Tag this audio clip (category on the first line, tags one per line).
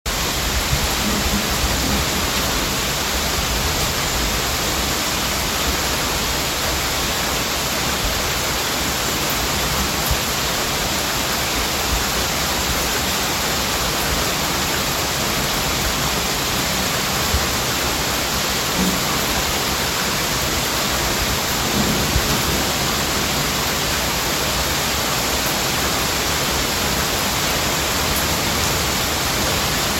Soundscapes > Nature
nature
raining